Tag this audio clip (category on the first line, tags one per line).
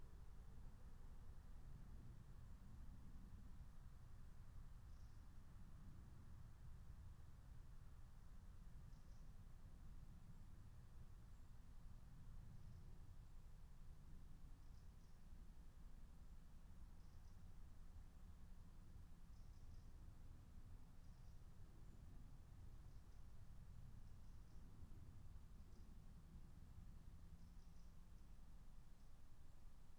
Soundscapes > Nature
nature
alice-holt-forest
raspberry-pi
soundscape
field-recording
phenological-recording
natural-soundscape
meadow